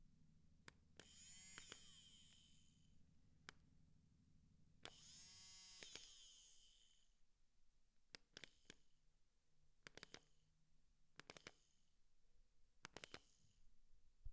Sound effects > Other mechanisms, engines, machines
Milk frother button
Pushing the rubbery button on the top of a milk frother
button, plastic, press, switch